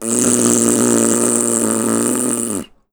Sound effects > Human sounds and actions
TOONVox-Blue Snowball Microphone, CU Raspberry, Buzzing Nicholas Judy TDC
A buzzing raspberry.
Blue-brand, Blue-Snowball, buzz, raspberry